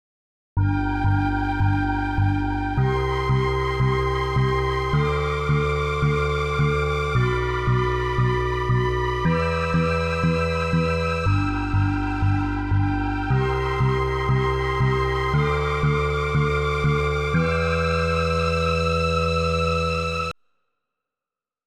Music > Other
Synth Sample made with Analog Lab 4. The synth is called Astronaut's Dream.
Spooky, AstronautsDream, Synth, AnalogLab, Sample